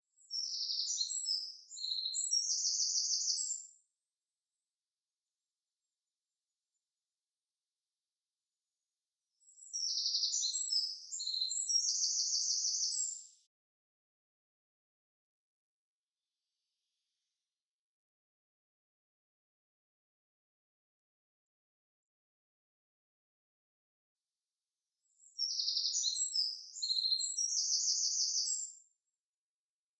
Sound effects > Natural elements and explosions
An edited recording of a European Wren. Edited using RX11.
Field, recording, birds, singing, chirping, wren